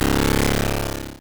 Sound effects > Electronic / Design
Electronic sound effect i made by importing a BMP image as raw data into Audacity.